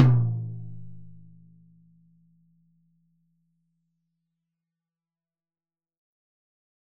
Music > Solo percussion
Med-low Tom - Oneshot 13 12 inch Sonor Force 3007 Maple Rack
beat, drum, drums, flam, loop, Medium-Tom, oneshot, perc, percussion, quality, real, roll, tomdrum, toms